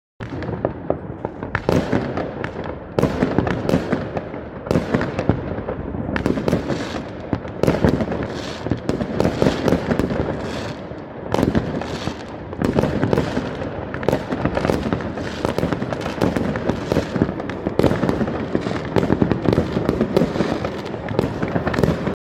Sound effects > Natural elements and explosions
close fireworks
Fireworks exploding in close area.
close, explosion, fireworks